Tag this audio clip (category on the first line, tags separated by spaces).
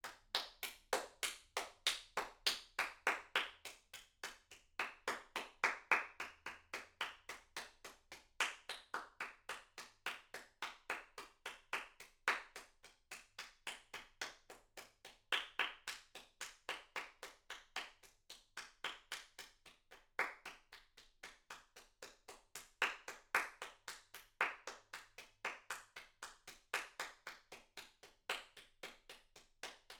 Sound effects > Human sounds and actions
Applaud Applauding Applause AV2 clap clapping FR-AV2 individual indoor NT5 person Rode solo Solo-crowd Tascam XY